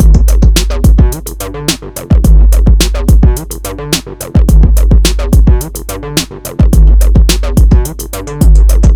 Instrument samples > Percussion
107bpm 808 kit with some 303 acid, loops perfectly for your creative productions